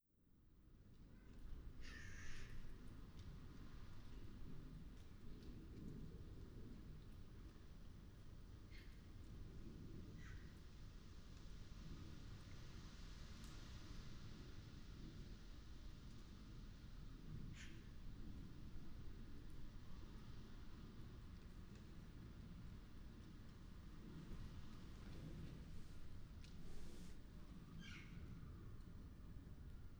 Soundscapes > Urban
Antwerp in the morning. Some traffic, pigions coeing. Recorded at 6am with 3DIO mic.